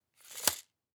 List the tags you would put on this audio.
Sound effects > Other mechanisms, engines, machines
garage
noise
rustle
sample

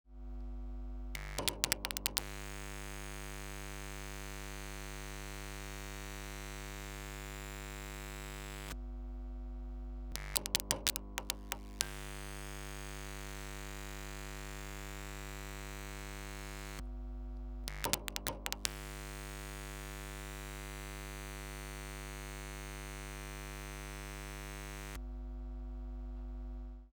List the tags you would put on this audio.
Sound effects > Objects / House appliances
Lamp
Tube
Fluorescent
Sound

